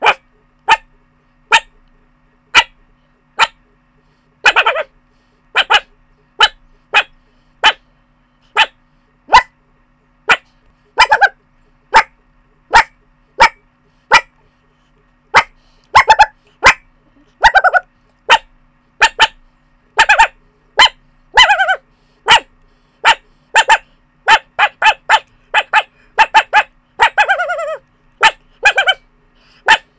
Sound effects > Animals
TOONAnml-Blue Snowball Microphone, CU Puppy Barking, Human Imitation, Cartoon Nicholas Judy TDC
A puppy barking. Human imitation. Cartoon.
imitation, barking, puppy, Blue-brand